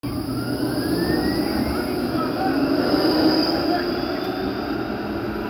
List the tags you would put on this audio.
Soundscapes > Urban
tram tramway

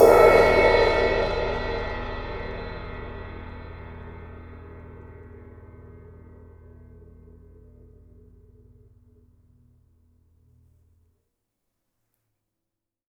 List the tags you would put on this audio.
Music > Solo instrument
Drum Custom 15inch Crash Metal Kit Sabian Oneshot Cymbals Perc Percussion Drums Cymbal

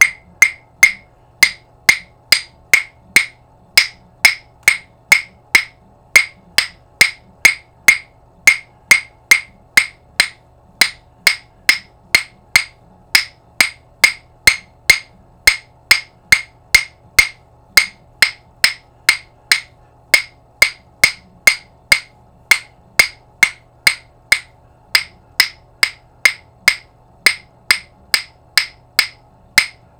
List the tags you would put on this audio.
Music > Solo percussion
Blue-Snowball Blue-brand

